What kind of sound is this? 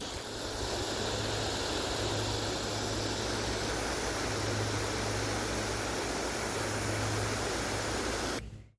Sound effects > Objects / House appliances
WATRSpray-Samsung Galaxy Smartphone, MCU Water Hose, Angled Mode Nicholas Judy TDC
A water hose spraying in angled mode.
angled, hose, Phone-recording, spray, water